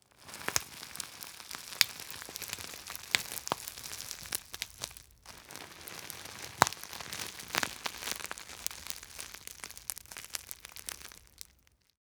Sound effects > Objects / House appliances
Plastic bag crunched
Stereo recording of small plastic bag being crunched with hand
bag, crunch, plastic